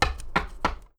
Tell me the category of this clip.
Sound effects > Objects / House appliances